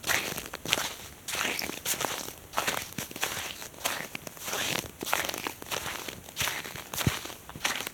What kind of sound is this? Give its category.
Sound effects > Human sounds and actions